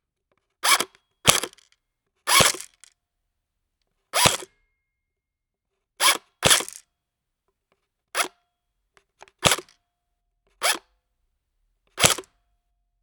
Sound effects > Objects / House appliances
Airsoft MP5 BB fire - DJImic3 Split mono
Reminder to never point anything gun like (toy or not) at people. If you know it's empty, if you know it's safe, please still practice good gun handling and respect. Subject : A electric airsoft MP5. Date YMD : 2026 January 23 Location : France Indoors. Hardware : DJI MIC 3 left = Trigger/motor. Dji mic 3 Right = Barrel mic. Weather : Processing : Trimmed and normalised in Audacity. Notes : Tips : There were 4 mics (NT5 Overhead, NT5 Motor/trigger, DJi MIC 3 trigger/motor, Dji Mic 3 barrel exit). No stereo pair really, but two recordings are grouped as a mono pair for safe-keeping and timing/sync. I suggest you mess with splitting /mixing them to mono recordings. If you know it's empty, if you know it's safe, please still practice good gun handling and respect.